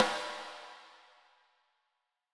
Music > Solo percussion
Snare Processed - Oneshot 50 - 14 by 6.5 inch Brass Ludwig
snare, perc, beat